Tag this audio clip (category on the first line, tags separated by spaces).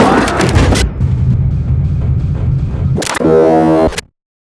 Sound effects > Other mechanisms, engines, machines
mechanical digital gears hydraulics clicking mechanism automation robot circuitry powerenergy servos processing movement metallic whirring clanking sound operation machine design motors actuators feedback robotic elements grinding synthetic